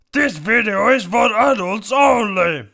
Speech > Solo speech
this video is for adults only grumpy voice
male, grumpy, warning